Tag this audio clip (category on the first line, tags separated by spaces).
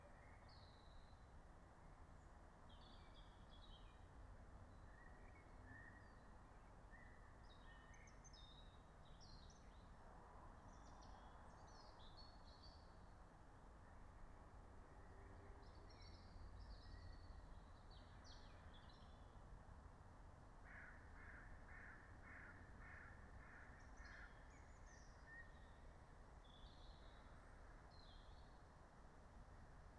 Soundscapes > Nature
phenological-recording nature alice-holt-forest natural-soundscape meadow soundscape raspberry-pi field-recording